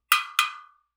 Sound effects > Objects / House appliances
aluminum can foley-015
metal, sfx, household, scrape